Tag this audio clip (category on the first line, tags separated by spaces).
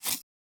Sound effects > Objects / House appliances
satin-ribbon tying